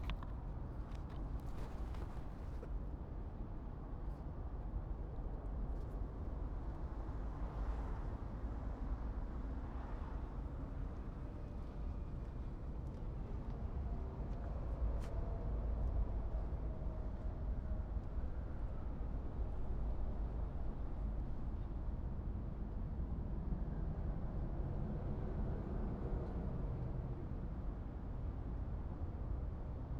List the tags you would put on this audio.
Soundscapes > Urban
Ambience
exterior
highway
traffic

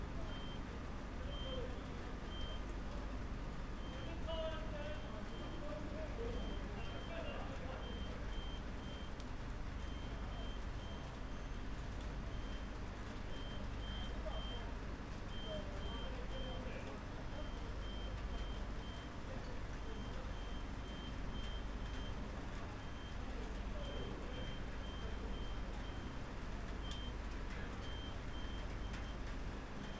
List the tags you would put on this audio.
Soundscapes > Urban
Field-Recording
Workers
Verko
Vehicles
Shed
Handling
Bike
Telehandler
Industrial
Talking
Shouting

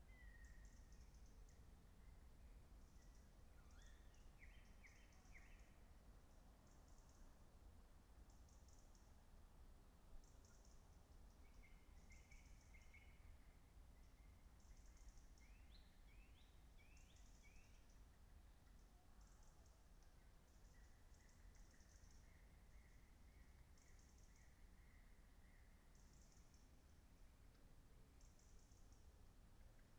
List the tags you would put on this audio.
Soundscapes > Nature
field-recording
raspberry-pi
weather-data
artistic-intervention
data-to-sound
nature
alice-holt-forest
natural-soundscape
Dendrophone
soundscape
modified-soundscape
sound-installation
phenological-recording

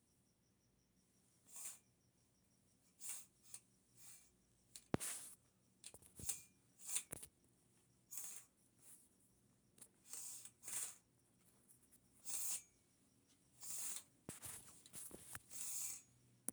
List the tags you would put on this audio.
Sound effects > Objects / House appliances
Courtain
Cover
Window

